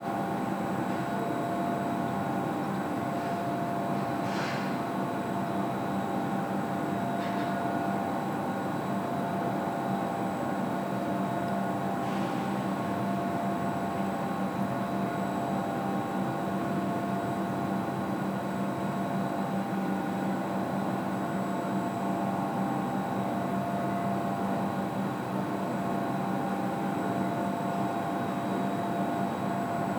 Soundscapes > Urban
Splott - Water Tower Electrical Hum 01 - Splott Beach Costal Path
splott, wales